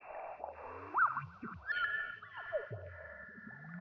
Soundscapes > Synthetic / Artificial
LFO Birsdsong 81
Birsdsong, LFO, massive